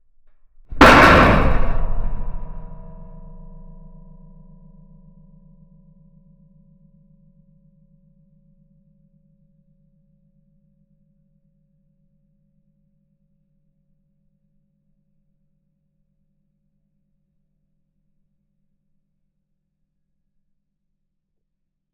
Sound effects > Other
metal crash reverberant 1
shopping cart. recorded with a zoom F3, homemade piezo pickup, and a diy piezo preamp kit